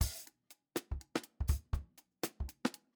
Music > Solo percussion
Short pitched loop 122 BPM in 6 over 8
Drum loop sample from recent studio session
studio, drums, recording, live, kit, loop